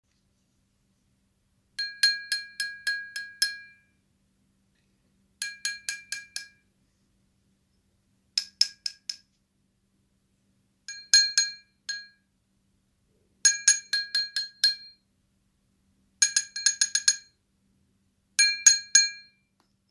Sound effects > Objects / House appliances
Glass handling 01
I tapped on an empty glass with a pen - Quality: bit of reverb from the lack of sound-proofing.